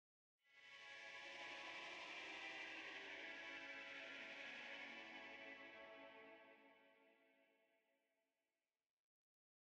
Sound effects > Electronic / Design
this is a noise i made using vital in fl studio to layer in my instrumental track
noise, stem, texture